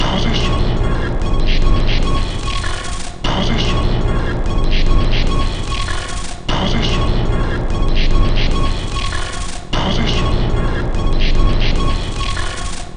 Instrument samples > Percussion
This 148bpm Drum Loop is good for composing Industrial/Electronic/Ambient songs or using as soundtrack to a sci-fi/suspense/horror indie game or short film.
Industrial, Drum, Dark, Alien, Weird, Loop, Ambient, Loopable, Samples, Underground, Soundtrack, Packs